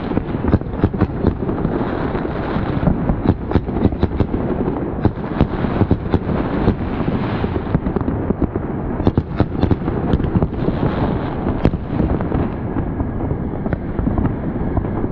Soundscapes > Other
War Zone (Lo-Fi)
Low audio quality sounding ambient noise of what could be gunshots, projectile impacts, mortar and rocket launches. In reality this is a recording of urban fireworks from new years eve 2025/2026 in Berlin, recorded with a GoPro Hero 8, slowed down 50% in Audacity. Search for 'nye' or similar and slow down any search result for an even better result.
ambience, battle, battlefield, bomb, bombs, boom, environment, explosion, fake, field, fire-works, fireworks, foley, gun, missile, mortar, new-years-eve, nye, rocket, slowed-down, war, warzone, zone